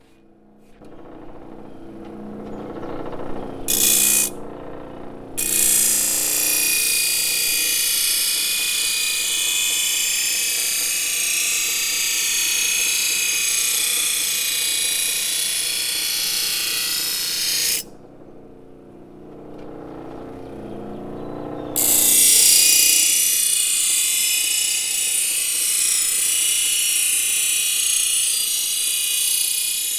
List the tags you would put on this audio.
Sound effects > Other mechanisms, engines, machines
abstract mechanical

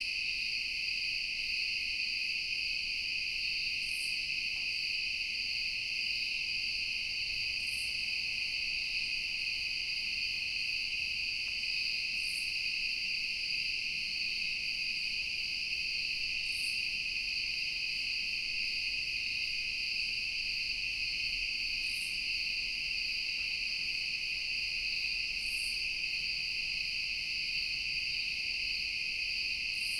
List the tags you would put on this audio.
Soundscapes > Nature
Bugs Crickets Fall Forest Massachusetts Night Stereo

